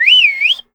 Sound effects > Human sounds and actions
WHSTHmn-Blue Snowball Microphone, CU Attention Getting Nicholas Judy TDC
An attention getting whistle.